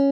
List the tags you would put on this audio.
Instrument samples > String
design arpeggio stratocaster sound tone guitar cheap

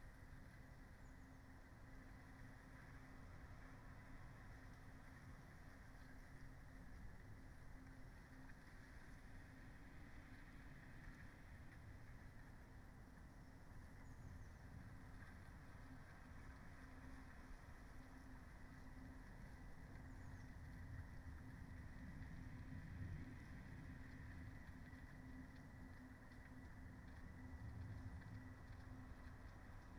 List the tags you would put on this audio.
Soundscapes > Nature
Dendrophone sound-installation natural-soundscape nature weather-data phenological-recording alice-holt-forest modified-soundscape raspberry-pi data-to-sound field-recording soundscape artistic-intervention

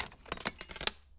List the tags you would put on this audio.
Sound effects > Other mechanisms, engines, machines
bam bang boom bop crackle foley fx knock little metal oneshot perc percussion pop rustle sfx shop sound strike thud tink tools wood

